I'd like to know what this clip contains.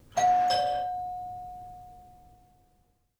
Sound effects > Objects / House appliances
Old styled doorbell ringing inside our house. Recorded with Sony ICD-UX570.